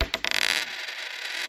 Sound effects > Objects / House appliances
OBJCoin-Samsung Galaxy Smartphone, CU Penny, Drop, Spin 03 Nicholas Judy TDC
drop, Phone-recording, foley, penny, spin